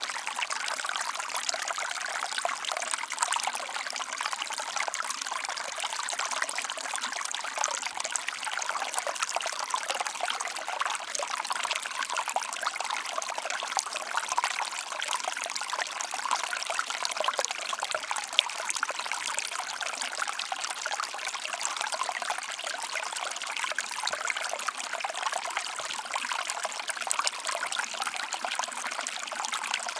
Soundscapes > Nature
Murmel Stream Small Water
creek, river, stream, Water, waterstream